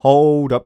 Speech > Solo speech
Hoold up
raw, Vocal, un-edited, up, FR-AV2, hold, Neumann, singletake, Male, oneshot, dry, chant, Tascam, voice, Mid-20s, Single-take, hype, U67, Man